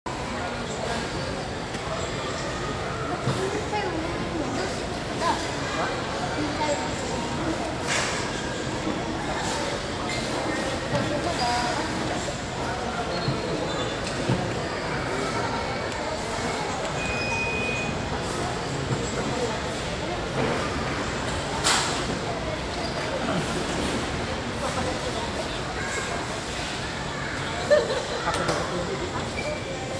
Soundscapes > Other
Airport Ambience
A nice ambience of an airport with announcements. This is recorded at Panglao International Airport just before we go on a plane back home. This recording is perfect for sound designers, urban ambience enthusiasts, game developers, filmmakers, or animators.